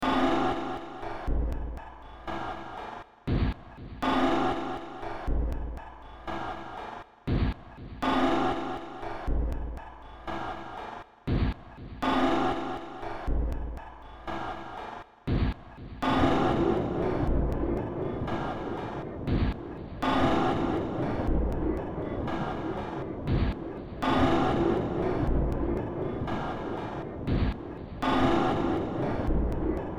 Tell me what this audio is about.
Music > Multiple instruments
Demo Track #3956 (Industraumatic)
Noise, Soundtrack, Underground, Games